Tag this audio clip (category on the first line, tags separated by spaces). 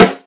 Instrument samples > Percussion
backlayer layering snare snare-layering snare-timbre timbre